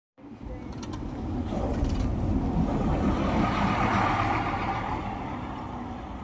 Sound effects > Vehicles
final bus 13
bus, finland